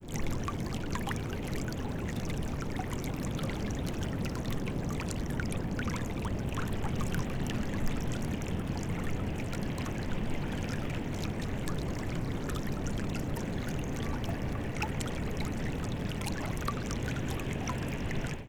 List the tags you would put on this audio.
Soundscapes > Nature
beach field-recording stream water

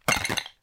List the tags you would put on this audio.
Sound effects > Other
ice combination spell lightning

